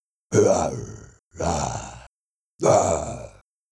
Sound effects > Other
A sound effect of a Monster voice. Could be useful for a certain project. Maybe could be used in an RPG for example as a monster enemy voice when attacking you. could have other uses as well its up to your imagination. It only has been edited to remove background noise of either side of clip and also volume was amplified by 5db overall due to a quiet recording. Also pitch lowered by -3 for a deeper animalistic voice. Helps to say if you need to quit down or rise volume you know where the baseline is. Made by R&B Sound Bites if you ever feel like crediting me ever for any of my sounds you use. Good to use for Indie game making or movie making. Get Creative!